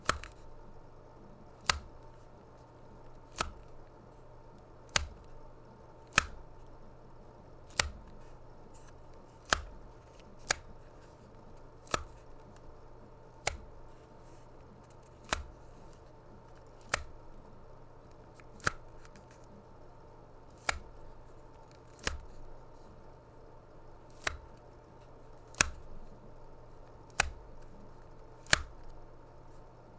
Sound effects > Objects / House appliances
COMCell-Blue Snowball Microphone T Mobile Wing Smartphone, Sliding On, Off Nicholas Judy TDC
An old T-Mobile wing smartphone sliding open and closed.
Blue-brand, Blue-Snowball, close, foley, old, open, slide, t-mobile, wing